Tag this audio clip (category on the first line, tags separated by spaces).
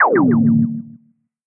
Sound effects > Electronic / Design
ambient artificial Minilogue MinilogueXD off Power-down power-off sfx space switch switching synth turn-off ui